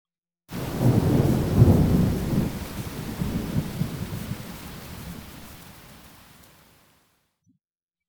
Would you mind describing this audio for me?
Music > Other
Tempesta in corso